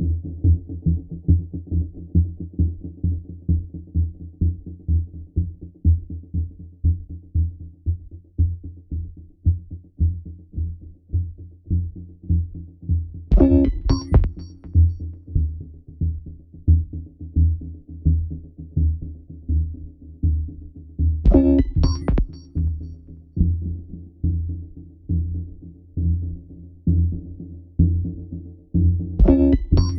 Instrument samples > Synths / Electronic
I synthesize sounds, textures, rhythmic patterns in ableton. Use it and get high.✩♬₊˚. These are sounds from my old synthesis sketches.